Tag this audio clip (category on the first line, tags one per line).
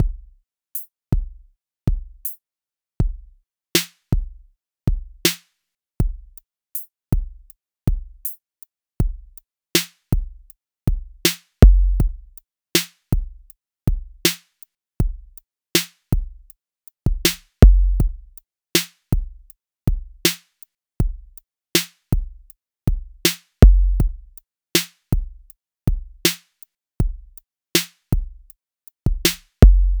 Music > Solo percussion
beat
bass